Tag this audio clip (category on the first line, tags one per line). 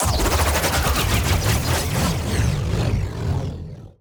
Sound effects > Electronic / Design
synthetic
electronic